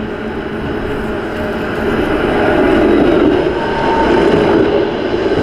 Sound effects > Vehicles
Tram00055700TramPassingBy
Audio of a tram passing nearby. Recording was taken during winter. Recorded at Tampere, Hervanta. The recording was done using the Rode VideoMic.
tram, vehicle, winter, field-recording, transportation, tramway, city